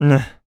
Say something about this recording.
Speech > Solo speech
Hurt - Nugh
dialogue, FR-AV2, Human, Hurt, Male, Man, Mid-20s, Neumann, NPC, oneshot, pain, singletake, Single-take, talk, Tascam, U67, Video-game, Vocal, voice, Voice-acting